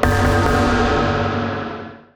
Instrument samples > Synths / Electronic
CVLT BASS 76
wobble, sub, lfo, wavetable, bass, stabs, subbass, synthbass, subs, drops, subwoofer, bassdrop, low